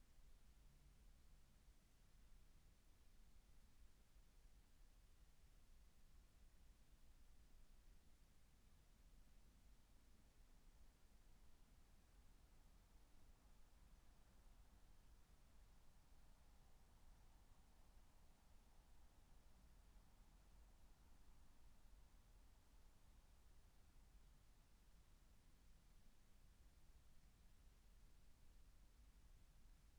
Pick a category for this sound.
Soundscapes > Nature